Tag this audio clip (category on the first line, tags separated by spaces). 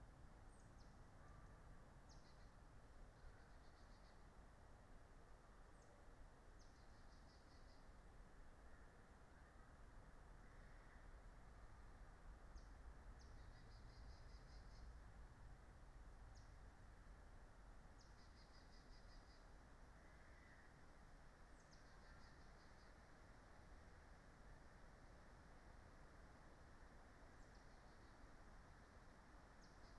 Soundscapes > Nature
nature natural-soundscape sound-installation